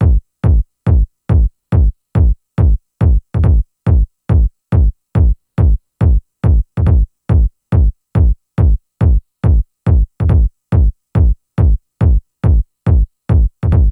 Instrument samples > Percussion
Basic beat created with a soft synth.